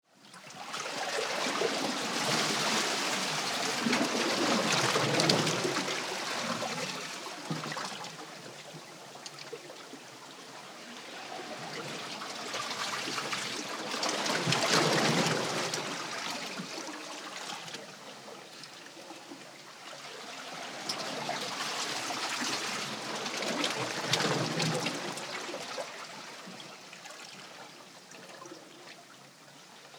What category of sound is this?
Soundscapes > Nature